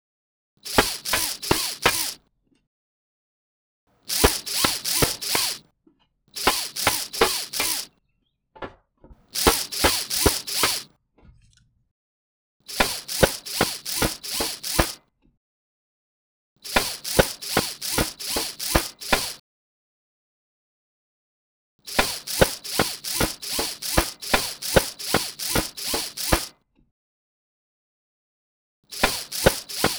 Sound effects > Other mechanisms, engines, machines
spider robot designed walk andor inspired 06162025
custom designed sound of imperial construction droid walking with servo motor sounds.
android, droid, automation, bot, robotic, servo, walk, motor, science, multi-legged, step, sci-fi, robot, metal, science-fiction, fiction, small, foot, scifi, ladder, machine, walking, starwars, footstep, bionic, spider, mechanical, designed